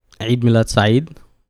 Speech > Solo speech
Happy birthday - Arabic
My Egyptian housemate saying _ In Arabic.
Happy, Birthday, Tascam, freesound20, male, FR-AV2, Arabic, SM58, Shure